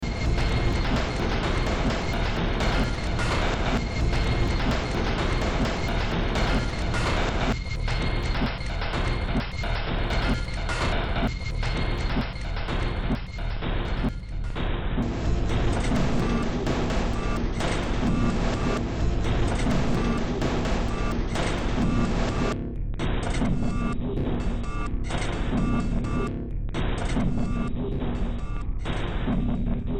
Music > Multiple instruments
Demo Track #3187 (Industraumatic)
Horror, Noise, Games, Underground, Sci-fi, Cyberpunk, Soundtrack, Industrial, Ambient